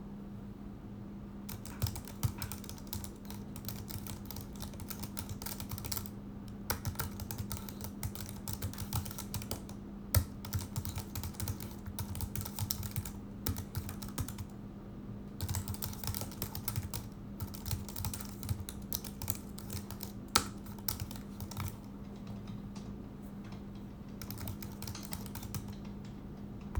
Sound effects > Electronic / Design
uter keyboard-Recorded with iphone 15 using MOTIV Audio